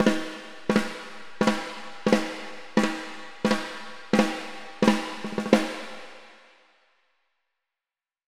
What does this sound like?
Music > Solo percussion
snare Processed - hard flam oneshot sequence quick - 14 by 6.5 inch Brass Ludwig
roll, snare, percussion, rimshot, hit, drumkit, perc, realdrum, snaredrum, drum, realdrums, oneshot, fx, snareroll, acoustic, crack, snares, ludwig, processed, brass, sfx, rim, beat, kit, hits, flam, rimshots, reverb, drums